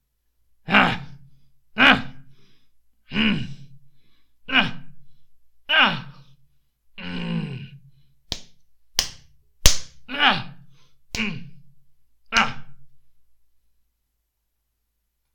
Sound effects > Human sounds and actions
Fighting Sounds
Me, pretending fight sounds.
agression, angry, fighting